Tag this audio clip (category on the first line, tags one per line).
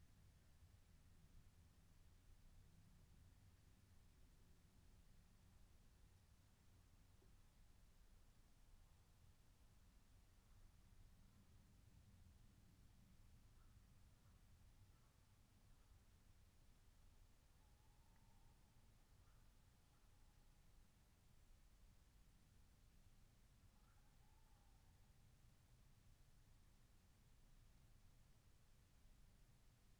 Soundscapes > Nature
sound-installation
modified-soundscape
raspberry-pi
alice-holt-forest
natural-soundscape
field-recording
weather-data
phenological-recording
artistic-intervention
data-to-sound
Dendrophone
nature
soundscape